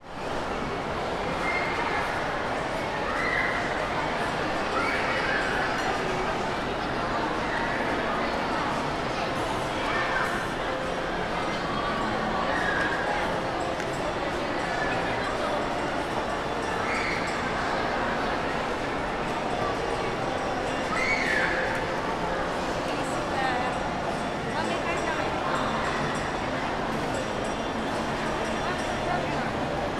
Soundscapes > Indoors
250802 141551 PH Crowded Filipino mall
At the entrance of SM Batangas shopping mall. I made this binaural recording in the entrance of SM Batangas shopping mall (in Batangas city, Batangas, Philippines). One can hear the atmosphere of this big crowded mall, with adults and children, music from the stores and restaurants, and ice cream sellers shaking their hand-bells (which is quite typical in the Philippines). Recorded in August 2025 with a Zoom H5studio (built-in XY microphones). Fade in/out applied in Audacity.
Batangas-city, crowded, kids, voices, walla